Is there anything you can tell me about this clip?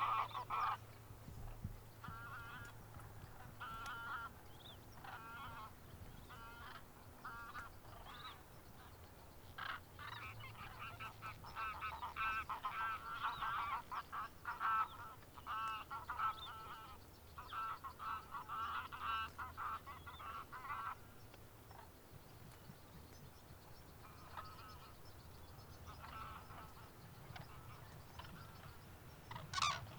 Soundscapes > Nature
We did some birdwatching, and the weather was wonderful! We’ve never seen or encountered so many cranes at once before in our lives! Truly incredible.
cranes, birdwatching, MixPre-6